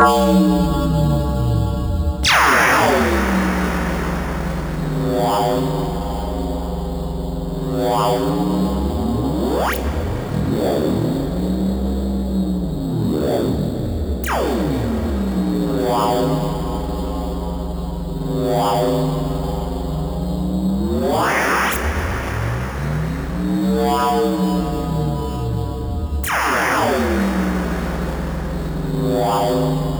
Sound effects > Experimental
Brain Signals effect
Strange noises generated from a synth
experimental, loop, randomized, strange